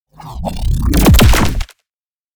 Sound effects > Electronic / Design
Double Heavy Scifi Impact
A doodle on heavy, futuristic impacts. Made with Serum 2, Ableton Granulator III, and foley recordings of myself punching various surfaces.
future, scifi, dark, sciencefiction, transition, big, laser, deep, punch, thump, sfx, impact, low, transient, weaponry, kick, heavy, futuristic, punchy, sounddesign, trailer, bass, cinematic, weapon, thud